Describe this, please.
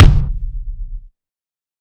Instrument samples > Percussion
kick SWE 1
• It fades to bass = I applied gradient high-cut after the middle of the sustain. • I blended the sourcesound with a 4.4 semitones low-pitched clonefile. • I zoomed in and I boosted semiperiod-by-semiperiod the regions close to the attack.
fat-drum, rock, trigger, percussion, fatdrum, headsound, drums, drum, headwave, kick, fatkick, natural, thrash-metal, thrash, groovy, death-metal, metal, hit, rhythm, beat, forcekick, fat-kick, bassdrum, pop, attack, mainkick, percussive, bass, Pearl, bass-drum